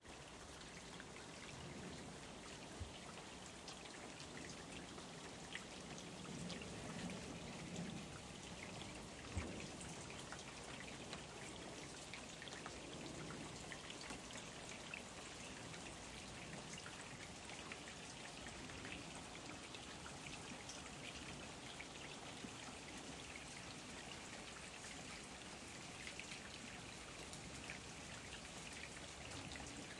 Soundscapes > Nature
Autumn downpour log-cabin Axelfors part 1
Autumn downpour on the wood terrace at log-cabin deep in the forest just outside Axelfors Sweden. Stream-flow. Close to motorway. On a windy day. Original field-recording.
Autumn, backwoods, downpour, field-recording, forest, log-cabin, nature, rain, rainstorm, rooftop, Scandinavia, Sweden, torrent, wilderness, windy, woodlands